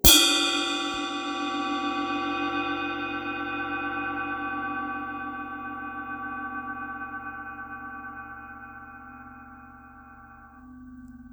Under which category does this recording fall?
Instrument samples > Percussion